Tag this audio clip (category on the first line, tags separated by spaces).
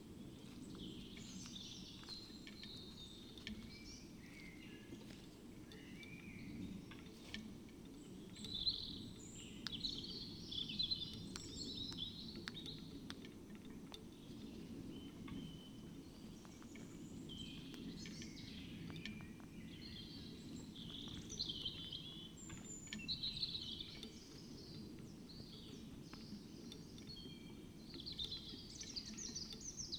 Soundscapes > Nature
alice-holt-forest raspberry-pi natural-soundscape modified-soundscape data-to-sound Dendrophone phenological-recording nature field-recording sound-installation soundscape weather-data artistic-intervention